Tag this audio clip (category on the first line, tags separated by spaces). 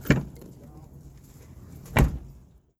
Sound effects > Vehicles
foley Phone-recording door close truck open